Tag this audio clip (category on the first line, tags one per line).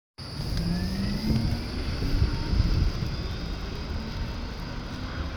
Soundscapes > Urban
recording
Tampere
tram